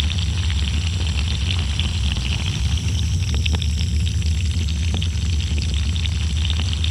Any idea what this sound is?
Sound effects > Objects / House appliances
A looping version of a recent hydrophone recording requested by a fellow member.